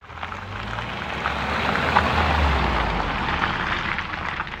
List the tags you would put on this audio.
Sound effects > Vehicles
car
combustionengine
driving

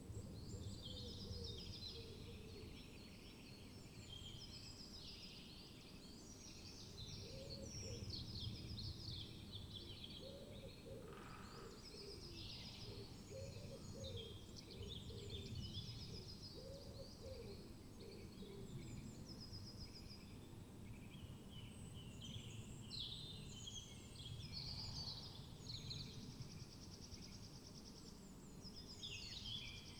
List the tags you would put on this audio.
Soundscapes > Nature
phenological-recording
Dendrophone
data-to-sound
nature
alice-holt-forest
sound-installation
soundscape
raspberry-pi
artistic-intervention
natural-soundscape
modified-soundscape
weather-data
field-recording

